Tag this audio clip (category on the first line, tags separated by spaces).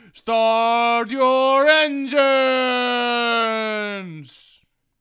Speech > Solo speech
man yelling race voice male